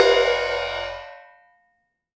Music > Solo instrument
Zildjian 16 inch Crash-006
Cymbals, Custom, Oneshot, Cymbal, Percussion, 16inch, Perc, Zildjian, Metal, Kit, Drum, Crash, Drums